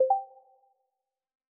Sound effects > Electronic / Design
Made with the Vital synth in FL Studio — [SFX: Pause]. Designed for casual games.
pause, Casual, pad, videogames